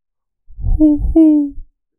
Sound effects > Animals
A male human reenacting an owl sound. I recorded this on a zoom audio recorder.